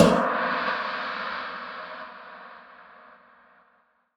Instrument samples > Percussion
cheapgong fake 1
I have many alternative versions in my crash folder. A blendfile of low-pitched crashes, a gong and a ride with the intent to be used as an audible crash in rock/metal/jazz music. Version 1 is almost unusable, except if you build sounds. tags: crash China gong fake artificial synthetic unnatural contrived metal metallic brass bronze cymbals sinocymbal Sinocymbal crashgong gongcrash fakery drum drums Sabian Soultone Stagg Zildjian Zultan low-pitched Meinl smash metallic Istanbul